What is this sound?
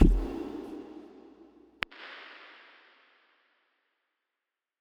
Sound effects > Experimental
snap crack perc fx with verb -glitchid 008
perc, percussion, fx, glitchy, abstract, hiphop, pop, sfx, snap, glitch, whizz, alien, crack, edm, zap, impacts, clap, laser, impact, lazer, experimental, idm, otherworldy